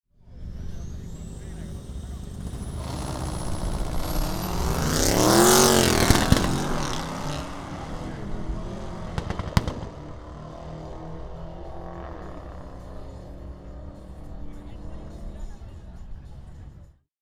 Soundscapes > Other
Wildcards Drag Race 2025 AUDIX D6, DPA 4055 Kick-Drum Microphone AND Audix TM1 (Measurement Microphone) [Kick Drum] | ----------------------------- | | Mic 1 Mic 2 (Close, punch) (Close, punch) | | ------- SUMMEERITUD --------- | Main Bass Track (Close Mics) | Low-cut filter ~20 Hz | Phase check / flip if needed | | +-----------------------+ | | Measurement Mic | (Room/Sub capture) | Level 5–15% | Low-pass filter ~120 Hz | EQ cut >120 Hz | Phase align | | | +-----> SUM to Bass Submix | Optional compression | Output / Mix
Drag, Wildcards